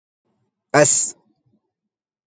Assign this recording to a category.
Sound effects > Other